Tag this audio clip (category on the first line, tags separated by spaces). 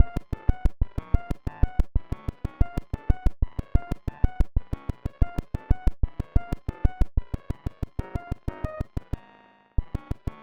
Music > Other
92bpm computer computing dialup glitch glitching glitchy internet melodic melody music retro sound